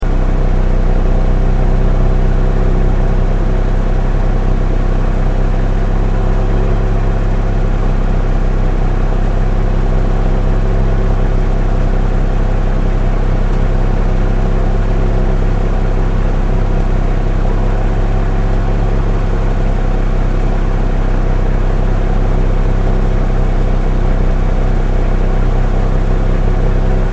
Sound effects > Vehicles
Porsche Cayenne Idling
Recorded from the exhaust from the car, mixed with the recording from the engine.